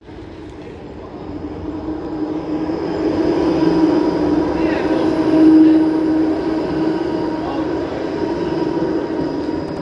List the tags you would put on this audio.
Sound effects > Vehicles
field-recording
Tampere
tram

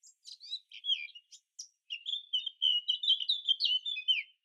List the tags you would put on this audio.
Sound effects > Animals
birds
nature
field-recording
chirp
morning
songbird
Bird
blackcap

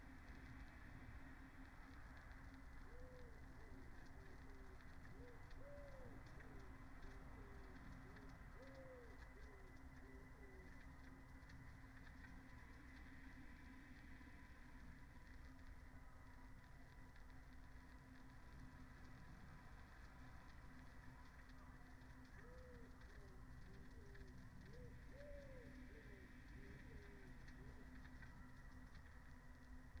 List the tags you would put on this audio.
Soundscapes > Nature
weather-data
data-to-sound
alice-holt-forest
phenological-recording
sound-installation
soundscape
Dendrophone
natural-soundscape
modified-soundscape
raspberry-pi
artistic-intervention
field-recording
nature

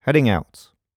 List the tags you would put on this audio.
Speech > Solo speech
FR-AV2
Adult
Single-mic-mono
VA
2025
Generic-lines
mid-20s
Hypercardioid
Tascam
Sennheiser
Shotgun-microphone
Shotgun-mic
heading-out
moving
Voice-acting
july
Male
Calm
MKE600
MKE-600

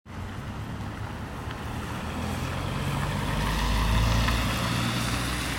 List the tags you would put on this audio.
Sound effects > Vehicles
vehicle
rain
tampere

